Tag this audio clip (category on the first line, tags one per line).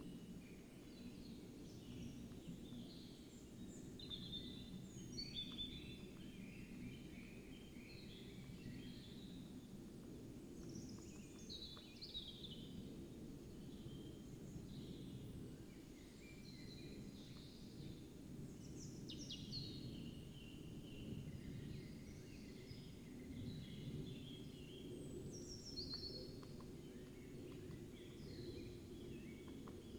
Soundscapes > Nature
modified-soundscape
sound-installation
weather-data
raspberry-pi
phenological-recording
natural-soundscape
soundscape
nature
alice-holt-forest
artistic-intervention
Dendrophone
data-to-sound
field-recording